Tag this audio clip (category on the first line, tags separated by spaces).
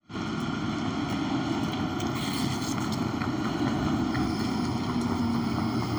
Sound effects > Vehicles
tram vehicle